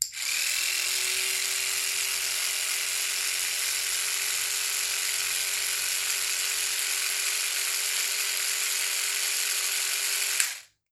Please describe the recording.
Sound effects > Objects / House appliances
MOTRElec-Samsung Galaxy Smartphone Electric Toothbrush, Turn On, Run, Off Nicholas Judy TDC
An electric toothbrush turning on, running and turning off.
turn-off, turn-on, run, Phone-recording, electric-toothbrush